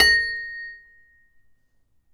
Sound effects > Other mechanisms, engines, machines
metal shop foley -078
bam, bang, boom, bop, crackle, foley, fx, knock, little, metal, oneshot, perc, percussion, pop, rustle, sfx, shop, sound, strike, thud, tink, tools, wood